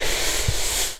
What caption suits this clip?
Sound effects > Other
spell wind a
8 - Weak Wind Spells Foleyed with a H6 Zoom Recorder, edited in ProTools
spell, wind